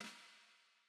Music > Solo percussion
Snare Processed - Oneshot 66 - 14 by 6.5 inch Brass Ludwig
rimshots, crack, hit, drums, hits, percussion, realdrums, acoustic, snares, snare, drum, perc, brass, reverb, rim, ludwig, kit, flam, beat, rimshot, processed, oneshot, realdrum, snaredrum, snareroll, sfx, drumkit, fx, roll